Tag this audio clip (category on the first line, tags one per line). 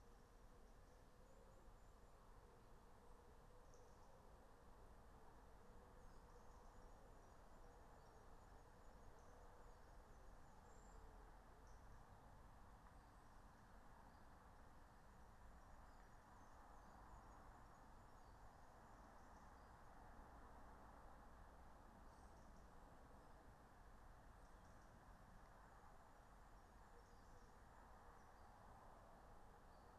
Soundscapes > Nature
natural-soundscape
field-recording
meadow
nature
raspberry-pi
soundscape
alice-holt-forest
phenological-recording